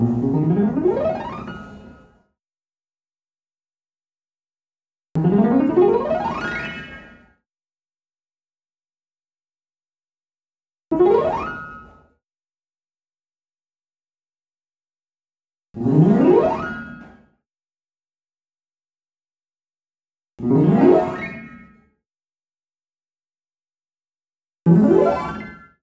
Music > Solo instrument
MUSCKeyd-Samsung Galaxy Smartphone, CU Grand Piano, Gliss Up, X6 Nicholas Judy TDC
A grand piano gliss up. Six times. Recorded at The Arc.
grand-piano, gliss, up, Phone-recording